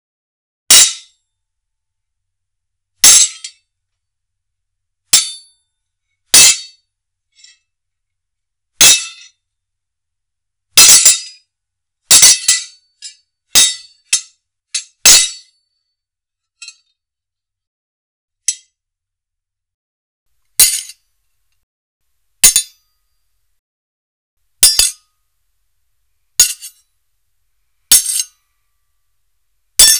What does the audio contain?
Sound effects > Objects / House appliances
custom sword fencing like rattly hits sounds inspired by heavens feel and demon slayer. utilizing metal silverware spoons and forks with two spoons tied or tapped to a string.